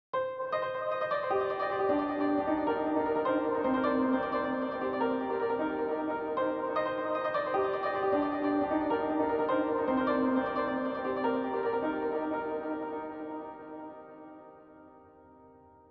Music > Solo instrument
piano ambient
moody piano melody loop made in FL studio with delay and granulizer.
background, ambient, moody, ambience, atmosphere